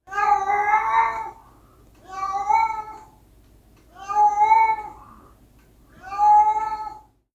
Sound effects > Animals
ANMLCat-Samsung Galaxy Smartphone, CU Sad Meows Nicholas Judy TDC
A sad cat meowing.
cat, meow, Phone-recording, sad